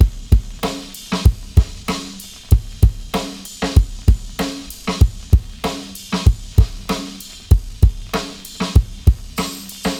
Music > Solo percussion
bb drum break loop boss 96
A short set of Acoustic Breakbeats recorded and processed on tape. All at 96BPM